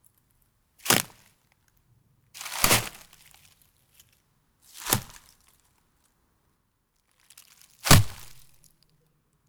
Sound effects > Natural elements and explosions
breaking stick leaves
Breaking stick on the leaves. Location: Poland Time: November 2025 Recorder: Zoom H6 - XYH-6 Mic Capsule
breaking, field-recording, forest, leaves, rustle, stick, twigs